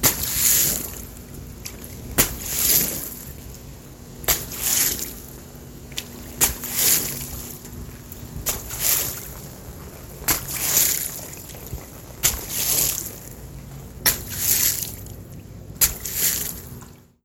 Sound effects > Natural elements and explosions
WATRSplsh-Samsung Galaxy Smartphone, CU Water, Jump In, Splash Nicholas Judy TDC
A jump in water splash.